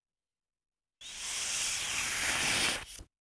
Sound effects > Objects / House appliances
Cleaned paper-ripping sound.